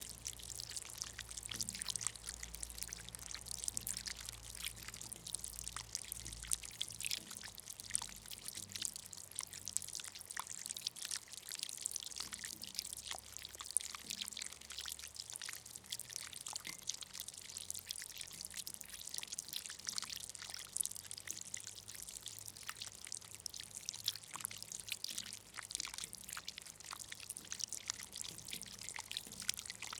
Soundscapes > Nature
pluie ecoulement Gouttière Villy
Close up recording of a little water flow at the output of a downspout. Drizzle in the background. Mics : EM272.